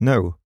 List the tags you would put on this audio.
Speech > Solo speech
2025 MKE-600 Sennheiser Calm no Shotgun-mic Tascam Adult july Male mid-20s Shotgun-microphone VA FR-AV2 Single-mic-mono MKE600 Generic-lines Voice-acting Hypercardioid

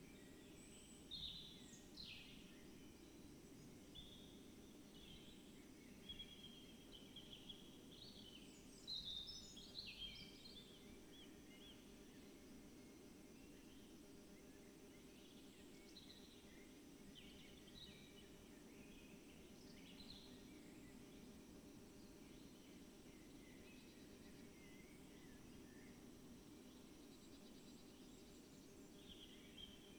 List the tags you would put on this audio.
Soundscapes > Nature
nature soundscape phenological-recording modified-soundscape natural-soundscape weather-data data-to-sound sound-installation alice-holt-forest artistic-intervention field-recording Dendrophone raspberry-pi

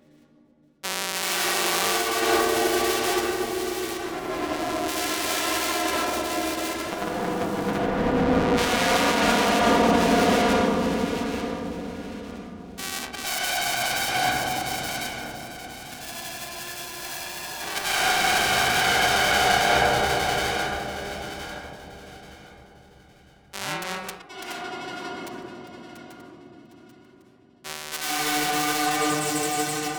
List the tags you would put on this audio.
Sound effects > Electronic / Design
acousmatic extended-technique musique objet-sonore tape-manipulation Unfiltered-Audio Vector-Grain

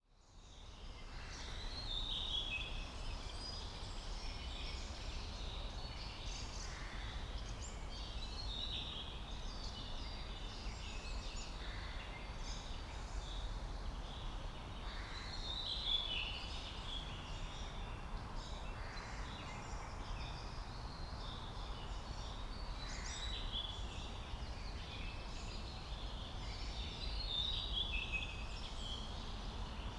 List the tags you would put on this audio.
Soundscapes > Nature
atmosphere summer nature rural calling background atmo park forest singing atmos environment

